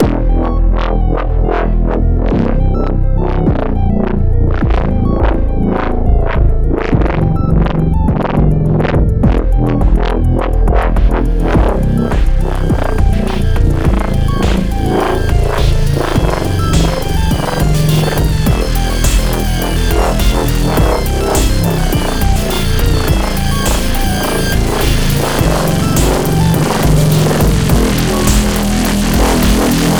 Music > Multiple instruments
fukbeat darkwave (alternate)
an alternate take on a darkwave beat and loop created in FL Studio with Pigments, Phase Plant, and lots of vst fx
dubstep, loop, loopable